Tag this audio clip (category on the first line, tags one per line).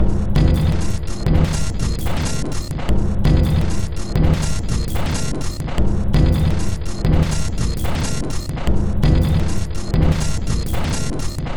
Instrument samples > Percussion
Soundtrack
Loop
Dark
Industrial
Samples
Drum
Ambient
Packs
Underground
Loopable
Weird
Alien